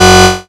Instrument samples > Synths / Electronic
DRILLBASS 2 Gb
bass, fm-synthesis